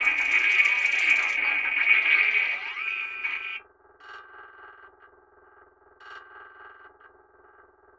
Sound effects > Electronic / Design
Ice Shingles
noise, abstract, christmas-sound-design, native-instruments-absynth, sound-design, absynth